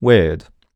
Speech > Solo speech
Confused - weeird
voice, oneshot, Mid-20s, weird, confused, word, Man, Neumann, Tascam, dialogue